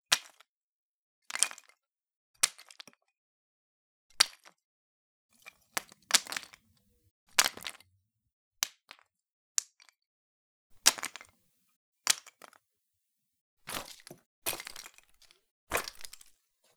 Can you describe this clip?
Sound effects > Objects / House appliances
Wood chips falling
Some wood chips being thrown on the ground and moved around